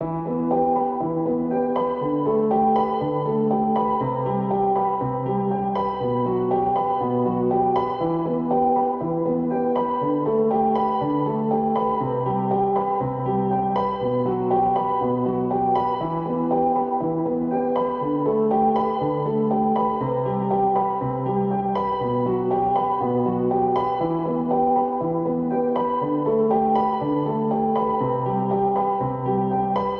Music > Solo instrument
Piano loops 050 efect 4 octave long loop 120 bpm
120, 120bpm, free, loop, music, piano, pianomusic, reverb, samples, simple, simplesamples